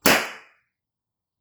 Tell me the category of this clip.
Sound effects > Natural elements and explosions